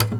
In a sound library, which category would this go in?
Music > Solo instrument